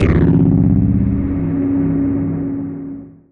Instrument samples > Synths / Electronic
CVLT BASS 128
drops, subs